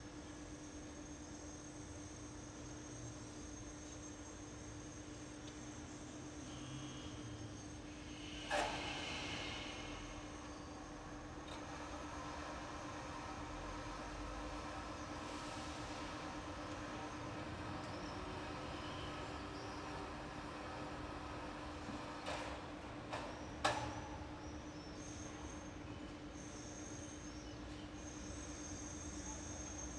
Soundscapes > Urban
Roma Rooftop EarlyMorning june2025 4
Morning (7.30AM) on the rooftop of the Swiss Institute, Roma, june 2025. Some birds : seagulls, swifts and parrots. Movements of a crane in the nearby construction site, jackhammers. General rumble of the city, distant traffic. An air conditioner until +/- 5'. Sur le toit de l'Istituto Svizzero de Rome le matin (7h30), juin 2025. Quelques mouettes, martinets, perruches. Les mouvements d'une grue, sur le site d'un chantier tout proche, ainsi que des marteaux-piqueurs. Bruit de fond de la ville et trafic distant. Ron-ron d'un climatiseur jusqu'à env.5mn.
air-conditioner, ambiance, birds, cityscape, construction, crane, crows, field-recording, istituto-svizzero, italia, Italie, jackhammer, morning, parakeet, parrot, Roma, rooftop, seagull, swift